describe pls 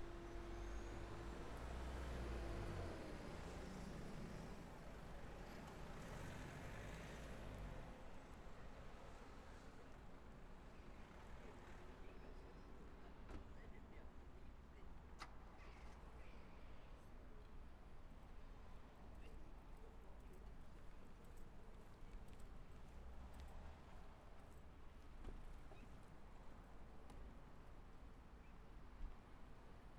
Soundscapes > Urban
Recorded 13:45 02/06/25 In front of a long building with different stores is this large parking lot. Shoppers arrive and park, walk in with their cart, or exit the stores and drive away. Mostly cars but a bit away is also a road with other traffic. It’s a bit windy this day. Zoom H5 recorder, track length cut otherwise unedited.